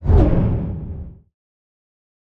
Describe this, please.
Sound effects > Other
Sound Design Elements Whoosh SFX 048
motion, ambient, element, dynamic, fx, cinematic, elements, production, transition, design, sweeping, movement, swoosh, sound, effect, audio, whoosh, effects, fast, trailer, film